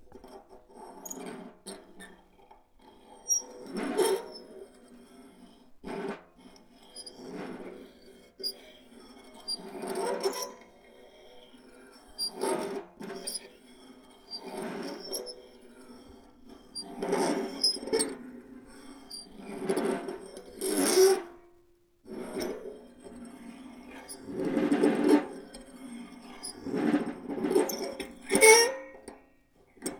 Instrument samples > Percussion
drum Scratch in the studio recorded in zoom h4n